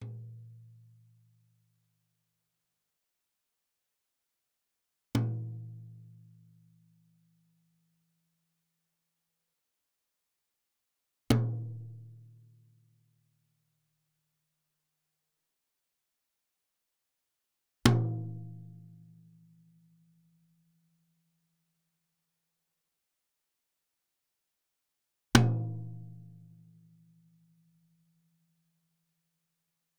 Music > Solo percussion
med low tom-velocity build seqence 3 with rods 12 inch Sonor Force 3007 Maple Rack
percussion, Medium-Tom, flam, quality, realdrum, drums, oneshot, roll, maple, drumkit, tomdrum, wood, loop, recording, toms, drum, med-tom, real, beat, kit, Tom, perc, acoustic